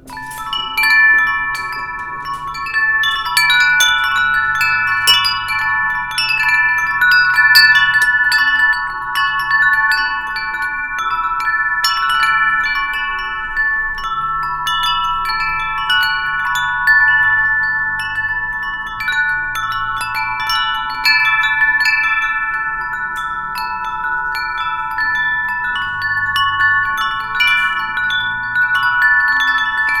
Music > Solo percussion
MUSCChim-Tascam DR05, CU Wind Chimes, Large, Pentatonic, Ringing Nicholas Judy TDC
Large pentatonic wind chimes ringing. Recorded at Hobby Lobby.
pentatonic, wind-chimes, Tascam-DR05, large, Tascam-brand, dream, ring, Tascam-DR-05